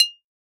Sound effects > Objects / House appliances
Masonjar Screw 3 Hit
Hitting a glass mason jar filled with water with a metal screw, recorded with an AKG C414 XLII microphone.